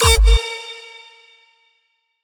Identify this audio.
Instrument samples > Percussion
Sample layered all are from Flstuido2025 original sample pack. Plugins used: Vocodex, Fruity reeverb 2, Zl Equalizer, Plasma, Waveshaper.
kick, rawstyle, colorstyle